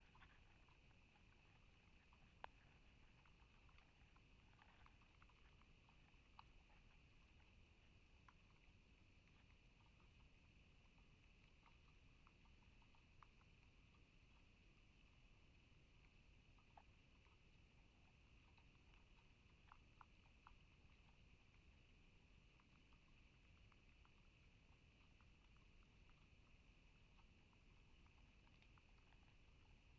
Soundscapes > Nature
Golena San Massimo underwater location2
Hydroscape - underwater recording in Golena San Massimo recorded with selfmade hydrophone + zoom H1